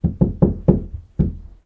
Sound effects > Objects / House appliances
Door cracking as it opens
Subject : A door hinge making this noise as it swings open narually. As if someone was knocking at it. Date YMD : 2025 04 19 Location : Indoor Gergueil France. Hardware : Tascam FR-AV2, Rode NT5 XY Weather : Processing : Trimmed and Normalized in Audacity.
2025, Dare2025-06A, Door, FR-AV2, hinge, indoor, knocking-like, NT5, Rode, Tascam, XY